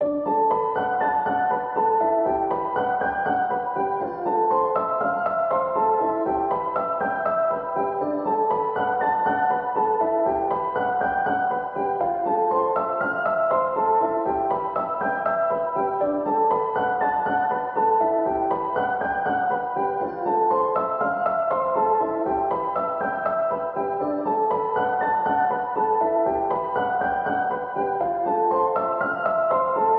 Music > Solo instrument
Piano loops 176 efect 4 octave long loop 120 bpm
120, 120bpm, free, loop, music, piano, pianomusic, reverb, samples, simple, simplesamples